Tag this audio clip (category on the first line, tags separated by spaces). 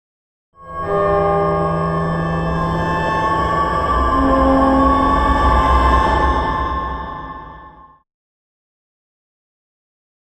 Sound effects > Other
distorted drone sound creepy granular gloomy effects ominous atmospheric horror mysterious textures soundscape evolving ambient pad eerie unsettling dark sfx abstract